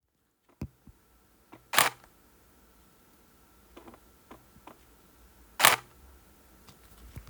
Sound effects > Other
Nikon D750 Shutter Sound
recording in my study room by iPhone 12mini with Nikon D750.
Camera, Shutter